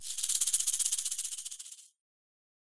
Instrument samples > Percussion
Dual shaker-005
Two shakers assembled by a wood handle were played to achieve some different dual-shaker transitions.